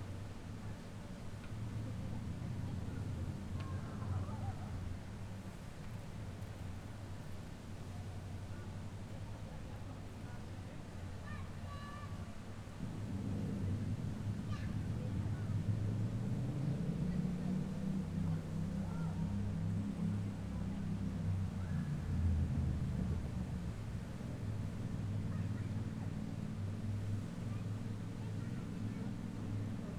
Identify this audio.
Soundscapes > Urban
AMBUrbn Large square and fountain with people in the evening, Malmö, Sweden
Recorded 19:18 13/05/25 A summer evening at the large square “Stora Torget”, where people walk past or sit on benches. Some kids make noise, and bicyclists bike over a manhole cover. There’s a fountain streaming nearby. There’s also a few seagulls and doves calling, as well as the urban ambience. Zoom H5 recorder, track length cut otherwise unedited.